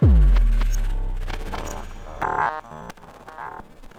Music > Multiple instruments
Industrial Estate 18
120bpm, industrial, soundtrack, loop, chaos, techno, Ableton